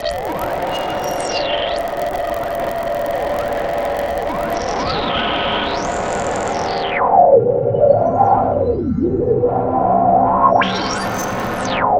Sound effects > Electronic / Design
Roil Down The Drain 20
cinematic, content-creator, dark-design, dark-soundscapes, dark-techno, drowning, horror, mystery, noise, noise-ambient, PPG-Wave, science-fiction, sci-fi, scifi, sound-design, vst